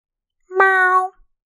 Sound effects > Animals
A human making a single meow sound like a cat. I recorded this on a zoom audio recorder.
cat, meowing, meow